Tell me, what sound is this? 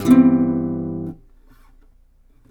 Music > Solo instrument
acoustic guitar pretty chord 3
acosutic, chord, chords, dissonant, guitar, instrument, knock, pretty, riff, slap, solo, string, strings, twang